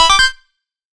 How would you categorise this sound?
Sound effects > Electronic / Design